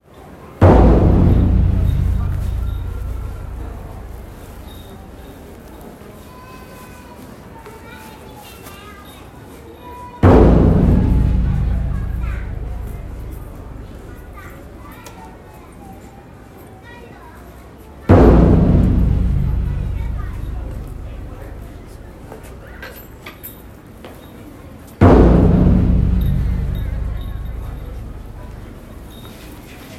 Soundscapes > Urban
Hie Shrine,Shichi-Go-San,Tsuri Taiko (RAW)
Late November of 2024, the visit of Hie Shrine in Tokyo. It's a great time cuz I saw the shrine hold the Shichi-go-san and a Japanese wedding at the same time. The recording carried a lot children sound : ) It's noisy but HAPPY.
japan, drum, ambiance, traditional, shrine, asia, background-sound, field-recording, ambience, soundscape, temple, background, cityscape, ambient, oriental, cinematic, tokyo, taiko